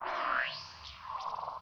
Soundscapes > Synthetic / Artificial
LFO Birdsong 26
Description in master track
birds, lfo